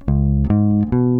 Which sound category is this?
Music > Solo instrument